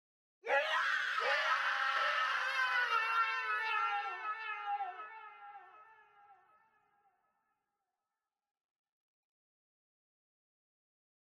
Speech > Other
Echo Scream
A scream with a delay.